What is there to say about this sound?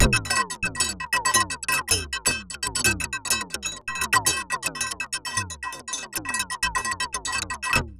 Music > Solo percussion
Percussion Metallic Loop 120bpm
drum-loop, quantized, rhythm, metallic, percussion-loop, sticks, groovy, percs, beat